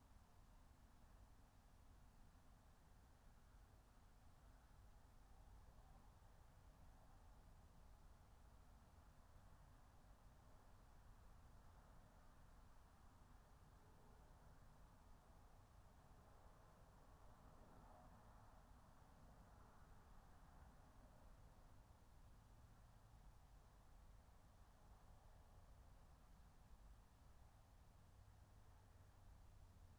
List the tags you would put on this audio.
Soundscapes > Nature
nature natural-soundscape alice-holt-forest raspberry-pi meadow soundscape phenological-recording field-recording

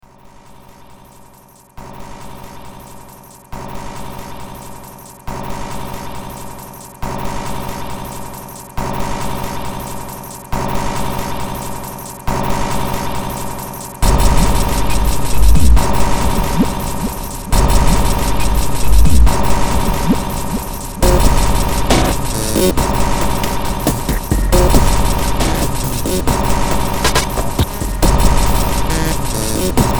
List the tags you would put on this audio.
Music > Multiple instruments
Cyberpunk Games Horror Soundtrack Ambient Industrial Noise Underground Sci-fi

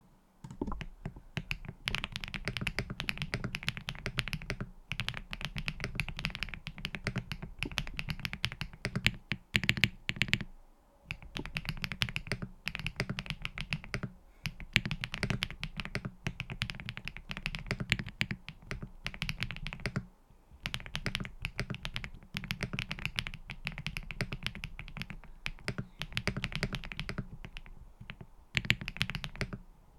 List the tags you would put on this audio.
Sound effects > Objects / House appliances
creamy keyboard rainy75 thocky typing

